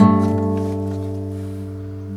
Music > Solo instrument
acoustic guitar oneshot shorts, knocks, twangs, plucks, notes, chords recorded with sm57 through audiofuse interface, mastered with reaper using fab filter comp